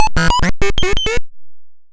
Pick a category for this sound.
Sound effects > Electronic / Design